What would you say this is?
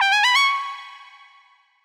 Sound effects > Electronic / Design
Stage Failed (Alternate)
A short sound effect (alternate version) of failing a stage.
arp, audio, game, pluck, soundfx, videogame